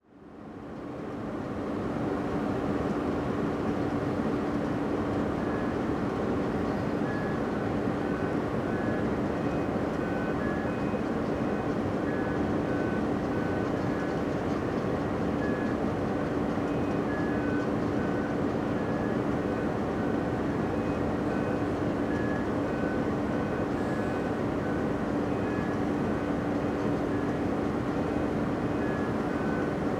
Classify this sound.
Soundscapes > Urban